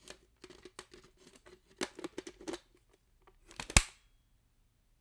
Sound effects > Objects / House appliances
Airsoft MP5 Mag insertion handling noise - DJImic3 Split mono
Air-soft, DJI, Mag, MP5